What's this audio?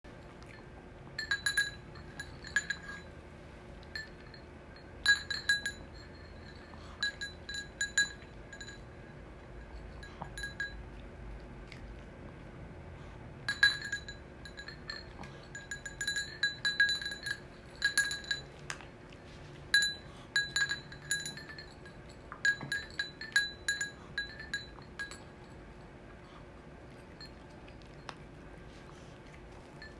Sound effects > Animals
Dog Dining Bowls 2
Two dogs eating out of porcelain bowls. Clanging and clattering of their dog tags hitting the edge of the bowls as they nosh.